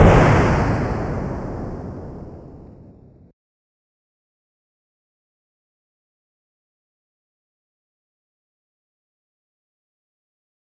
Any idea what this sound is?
Sound effects > Electronic / Design
Retro Game Explosion-2
I was dragged a snare from FLstudio original sample pack randomly, and put it into sampler, then I just scraped keys in low pitch range and recorded it with Edison. Processed with ZL EQ and Waveshaper.
Game, retro, Explosion, video-game, 8-bit, SFX, FX, Boom